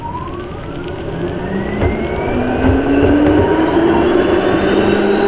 Sound effects > Vehicles
Tram00053854TramDeparting
Tram departing from a nearby stop. Recorded during the winter in an urban environment. Recorded at Tampere, Hervanta. The recording was done using the Rode VideoMic.
vehicle, field-recording, tramway, transportation, tram, winter, city